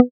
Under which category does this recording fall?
Instrument samples > Synths / Electronic